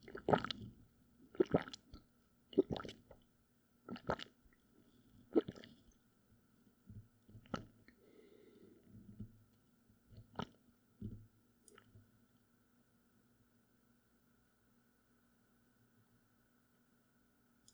Sound effects > Human sounds and actions
Recorded on a Shure MV7 using the XLR output. Water was swallowed with the throat positioned directly over the microphone. Gain was maxed on the digital interface to best capture the sound. Noise cancellation was used to remove unwanted background noise.
drinking, liquid, gulping, swallow, drink, water, gulp